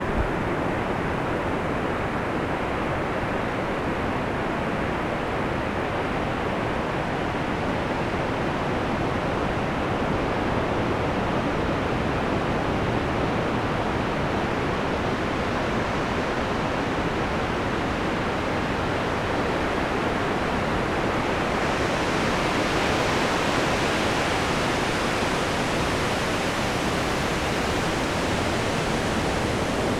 Soundscapes > Nature
Manzanita, 03/2025, close to waves with sea foam
Sounds of sea foam at the very beginning, then mostly just wave sounds.
beach, field-recording, manzanita, ocean, oregon, seafoam, water, wave